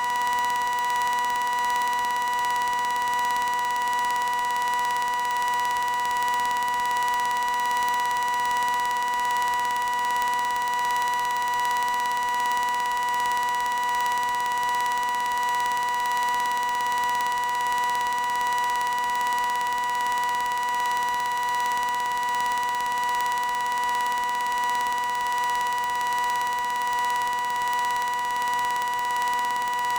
Soundscapes > Other
High Pitched Rhythmic Drone
Induction loop recording of household appliance. Recorded on zoom h2n.
loop, synth, glitch, digital, lo-fi, drone, electronic, high-pitched, noise, induction-loop